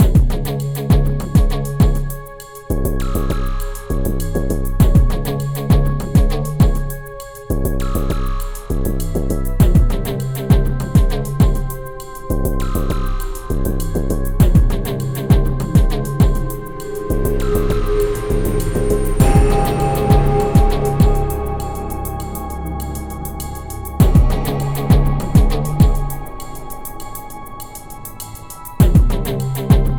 Music > Multiple instruments
Gangster's Malevolence (16-Bar Loop)
16-bar-loop, crime-procedural, crime-procedural-loop, crime-procedural-music, criminal-investigation-loop, espionge-loop, gangster-theme, guitar-loop, hard-rock-loop, rhythm-guitar-loop